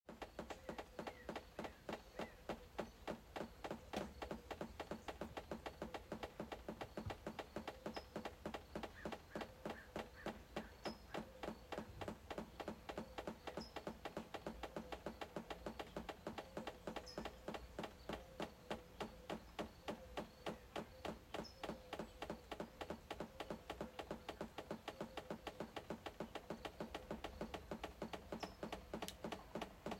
Soundscapes > Nature
Roof drip morning ambience synchronized 07/04/2022
Roof drip morning ambience synchronized
field-recordings, water-drops, Sounds-drip